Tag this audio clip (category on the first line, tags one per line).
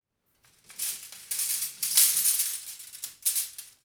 Sound effects > Objects / House appliances
clothes
opening
drying
metal
rack
jangle
aluminum